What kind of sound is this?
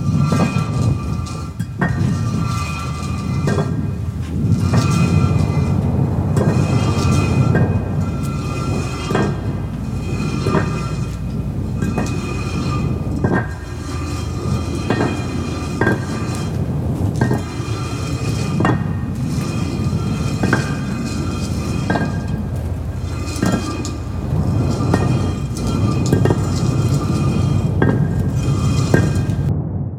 Sound effects > Experimental
walking stone statue
I recorded this sound in my basement using a brick shaped stone snowman with a cinderblock for the dragging sound.